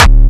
Instrument samples > Percussion
Classic Crispy Kick 1-D
It's very simple to synthed this sample, you just need to layer my punch sample of #G, and use overdrive FX to distory a sine wave bass, then layer them both. Final-Processed with: Waveshaper, ZL EQ.
crispy, powerkick